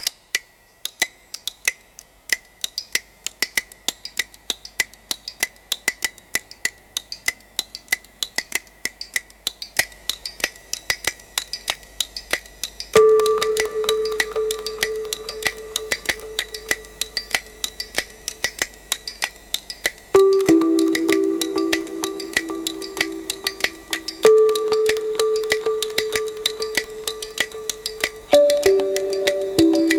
Music > Multiple instruments
A-Tonal Awakenings - Terra Firma - Soil Organic Tribal Ambient Meditative Texture
This is part of my experiments to generate excerpts and melodies which can connect soul and earth by using AI. AI: Suno Prompt: water, atonal, non-melodic, calm, wood, low tones, reverb, delay, background, ambient, tribal, organic
earth, organic, relaxing, soil, soundscape, texture